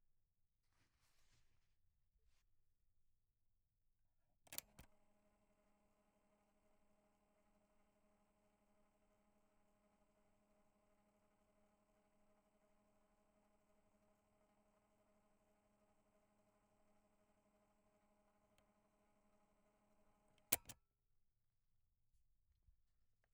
Sound effects > Other mechanisms, engines, machines
tape deck press play
Pressing play on a cassette tape with a tape in it. captured on a mixPre iii with a sennheiser shotgun mic. No extra processing.
tapedeck, tape, play, cassette